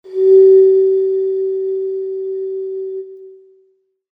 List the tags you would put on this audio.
Instrument samples > Other
blow
bottle
calm
experimental
high-note
high-pitch
hum
instrument
instrumental
intrumental
music
noise
note
traditional
wind

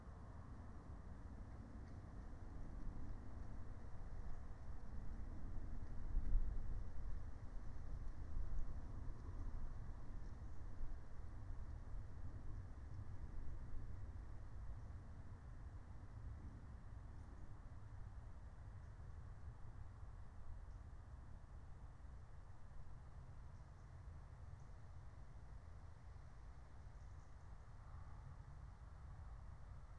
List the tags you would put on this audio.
Soundscapes > Nature
nature
field-recording
phenological-recording
meadow
raspberry-pi
soundscape
alice-holt-forest
natural-soundscape

